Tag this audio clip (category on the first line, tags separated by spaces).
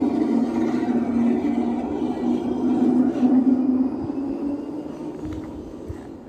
Soundscapes > Urban
finland hervanta tram